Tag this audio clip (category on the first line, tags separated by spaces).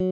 Instrument samples > String
arpeggio cheap design guitar sound stratocaster tone